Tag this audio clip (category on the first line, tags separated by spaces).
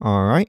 Speech > Solo speech
Tascam MKE-600 MKE600 Shotgun-mic FR-AV2 Generic-lines Alright Single-mic-mono Sennheiser july VA Male Hypercardioid 2025 mid-20s Adult Voice-acting Calm Shotgun-microphone